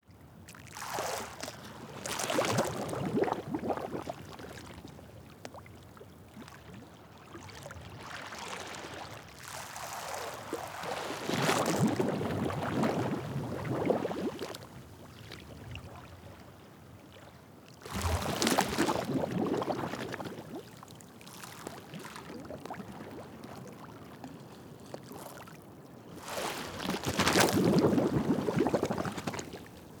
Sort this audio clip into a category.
Sound effects > Natural elements and explosions